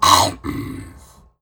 Sound effects > Human sounds and actions
A vocal chomp.